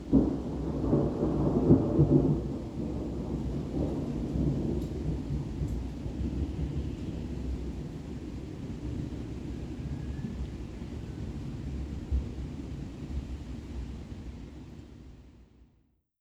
Sound effects > Natural elements and explosions
THUN-Samsung Galaxy Smartphone, MCU Thunder Booms, Rumbles Nicholas Judy TDC
Thunder booms and rumbles.
boom, Phone-recording, rumble, thunder